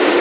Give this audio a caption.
Instrument samples > Percussion
We balance stereo sounds and we pan mono sounds. Use it balanced to the left or to the right. It sounds better with an alternating balancing bias.